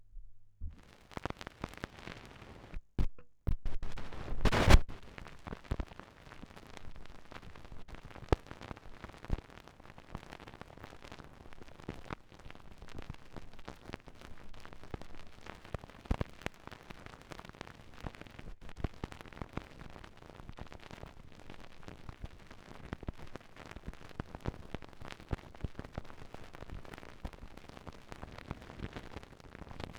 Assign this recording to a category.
Sound effects > Objects / House appliances